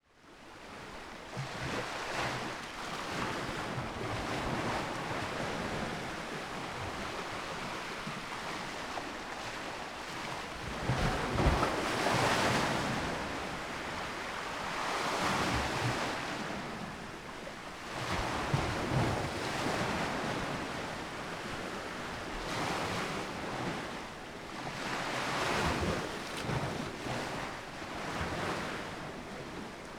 Sound effects > Natural elements and explosions
250816 034008 PH Sea waves VS concrete terrace
Sea waves versus concrete terrace. I made this recording early in the morning, from the window of a transient house located in Wawa, on Tingloy island, in Batangas province, Philippines. One can hear the sea waves lapping, hitting and splashing on the concrete terrace of the house in the night. Recorded in August 2025 with a Zoom H5studio (built-in XY microphones). Fade in/out applied in Audacity.